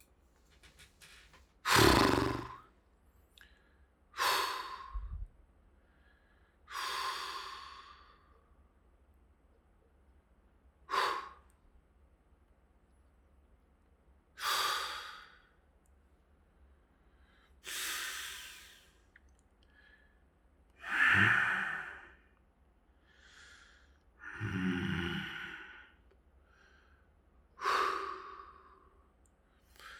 Sound effects > Human sounds and actions
Male annoyance impatience sigh